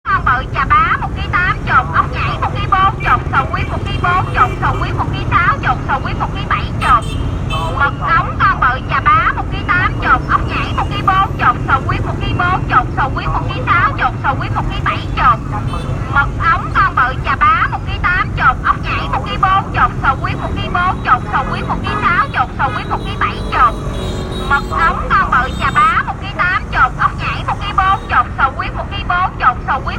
Speech > Solo speech
Woman sell squid and clam say 'Mực ống con bự chà bá một ký 80, mực ống nháy một ký 40, sò huyết một ký 40, sò huyết một ký 60, sò huyết một ký 70!'. Record use iPhone 7 Plus 2025.05.07 17:18